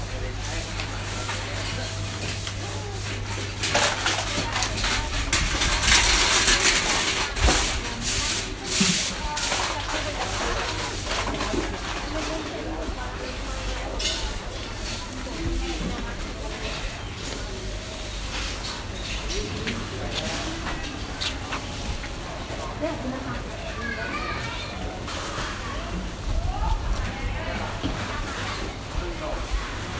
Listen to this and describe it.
Soundscapes > Urban
Market Ambience, Chinatown, Bangkok, Thailand (Feb 22, 2019)
Recording from a market in Chinatown, Bangkok, Thailand, on February 22, 2019. Features vendor calls, bargaining voices and the busy energy of the marketplace.
ambience, crowd, Chinatown, busy, urban, vendors, Thailand